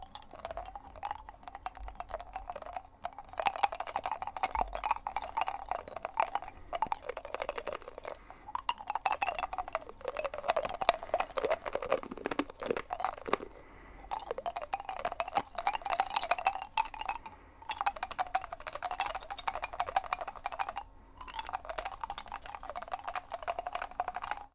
Sound effects > Objects / House appliances
GAMEMisc-MCU Dice, Shake In Teeth Nicholas Judy TDC
Shaking dice in the teeth.
Blue-brand, Blue-Snowball, dice, foley, shake, teeth